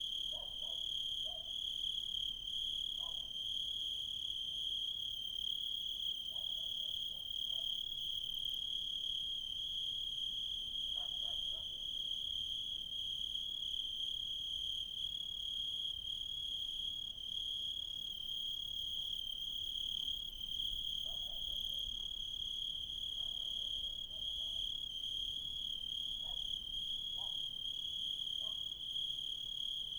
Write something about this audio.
Soundscapes > Nature
AMBIENCE EXT NIGHT-COUNTRYSIDE PORTUGAL
Night ambience recorded in Malhadas, Miranda do Douro, Portugal. Usual insects sounds, dogs barking, a car drive by, an ocasional far low frequency thump used to scare hogs from the crops. The gear used: zoom f8 recorder sennheiser mkh 8050+8030 MS cinela zephyx wind protection.